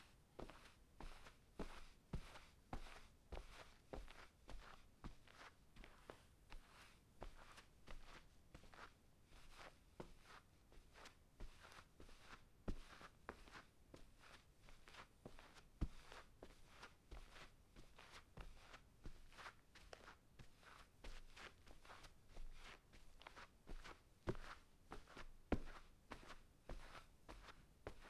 Sound effects > Objects / House appliances
SFX Indoor CarpetSteps
Record zoom-h1n
ambient, background, carpet, field-recording, footsteps, indoor, soft, sound, soundscape, subtle, texture